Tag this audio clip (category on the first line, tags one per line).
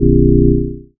Instrument samples > Synths / Electronic
fm-synthesis
bass